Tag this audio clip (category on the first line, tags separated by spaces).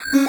Sound effects > Electronic / Design
button alert notifications digital messages options menu UI interface